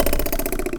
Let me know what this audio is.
Sound effects > Objects / House appliances
knife and metal beam vibrations clicks dings and sfx-083
Beam, Clang, ding, Foley, FX, Klang, Metal, metallic, Perc, SFX, ting, Trippy, Vibrate, Vibration, Wobble